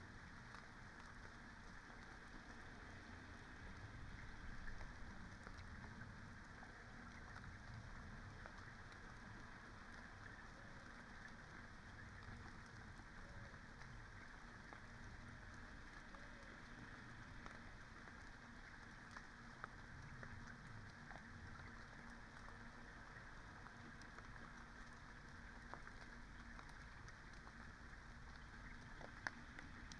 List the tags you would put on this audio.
Soundscapes > Nature
nature
artistic-intervention
soundscape
Dendrophone
raspberry-pi
sound-installation
weather-data
modified-soundscape
alice-holt-forest
phenological-recording
field-recording
natural-soundscape
data-to-sound

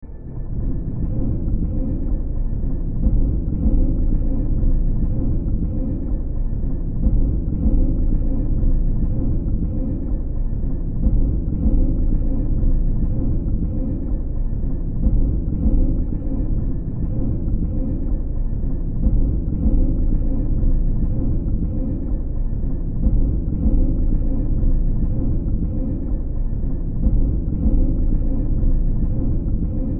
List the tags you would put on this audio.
Soundscapes > Synthetic / Artificial
Ambience
Darkness
Drone
Games
Gothic
Hill
Horror
Sci-fi
Silent
Soundtrack
Survival
Underground
Weird

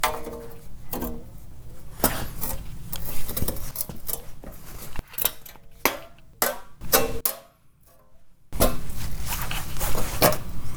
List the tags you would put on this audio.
Sound effects > Other mechanisms, engines, machines
foley
fx
handsaw
hit
household
metal
metallic
perc
percussion
plank
saw
sfx
shop
smack
tool
twang
twangy
vibe
vibration

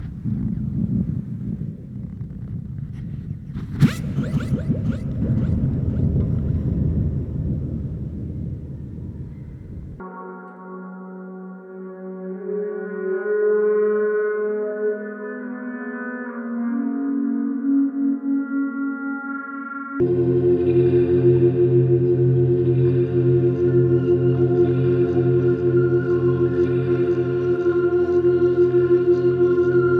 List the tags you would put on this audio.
Instrument samples > Synths / Electronic
pad
Ambient

